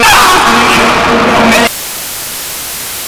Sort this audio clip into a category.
Sound effects > Other